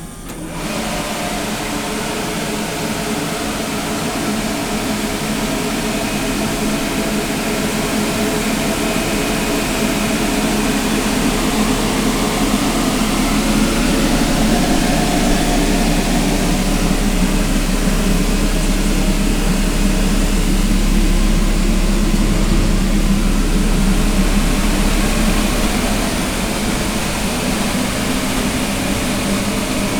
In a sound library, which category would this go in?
Sound effects > Other mechanisms, engines, machines